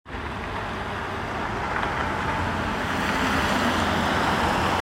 Sound effects > Vehicles
A car passing by in Tampere, Finland. Recorded on an iPhone 16's built-in microphone. This clip is recorded for the COMP.SGN.120 Introduction to Audio and Speech Processing course project work in Tampere University.